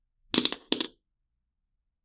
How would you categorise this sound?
Sound effects > Objects / House appliances